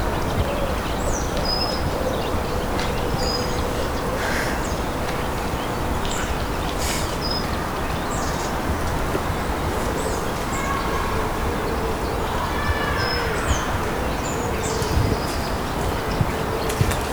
Soundscapes > Nature
Urban Ambience Recording in collab with Narcís Monturiol Institute, Barcelona, March 2025. Using a Zoom H-1 Recorder.